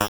Sound effects > Electronic / Design
RGS-Glitch One Shot 19
Effect, FX, Glitch, Noise, One-shot